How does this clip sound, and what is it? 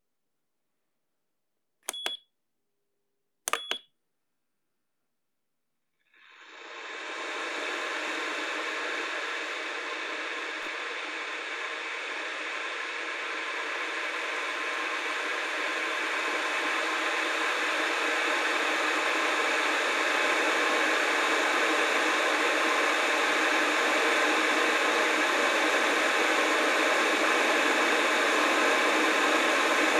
Sound effects > Objects / House appliances
FOODMisc 32bF Modern Kettle Boiling Start to finish
Water boiling in a kettle from start to finish with a Zoom H4N inbuilt stereo mic only
boiling, boil, bubbles, sfx, bubbling, kettle, bubble, water